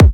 Instrument samples > Percussion
EDM Kick 1
A kick retouched from Flstudio original sample pack: MA Obscura Kick. Just tweak a lot of pogo and pitch amount. Then saturated and Compressed with Fruity Limiter and Waveshaper. EQ with ZL EQ.
Basshouse, EDM, house-music, Kick, progressivehouse